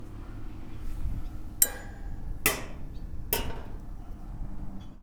Sound effects > Objects / House appliances
Junkyard Foley and FX Percs (Metal, Clanks, Scrapes, Bangs, Scrap, and Machines) 111
scrape, dumpster, tube, Metal, FX, trash